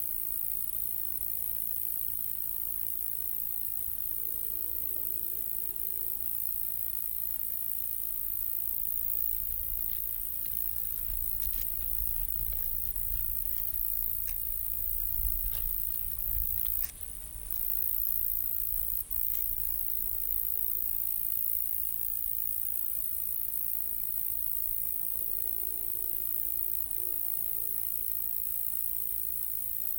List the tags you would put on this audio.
Soundscapes > Nature
Gergueil
country-side
Cote-dOr
Bourgogne
France

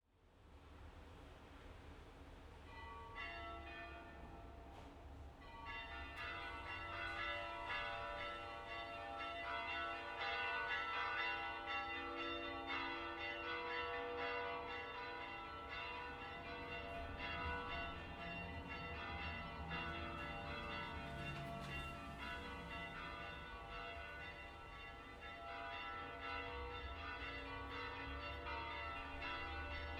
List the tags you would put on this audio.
Soundscapes > Urban
bells
Greek
Orthodox

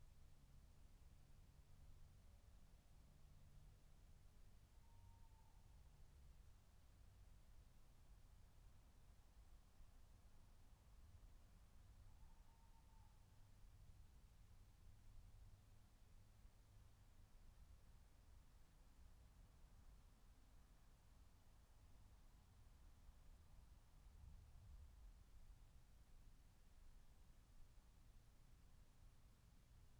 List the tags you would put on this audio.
Soundscapes > Nature
phenological-recording
alice-holt-forest
natural-soundscape
soundscape
field-recording
raspberry-pi
nature
meadow

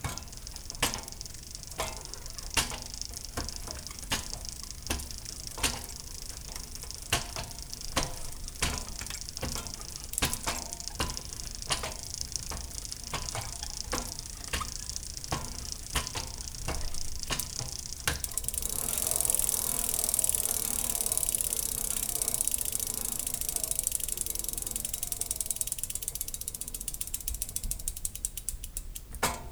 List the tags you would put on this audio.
Sound effects > Other mechanisms, engines, machines
pedaling
gears
bike
bicycle

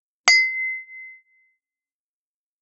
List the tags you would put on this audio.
Sound effects > Objects / House appliances
glass
reverb
toast
glasses
ding
wine-glass
wine

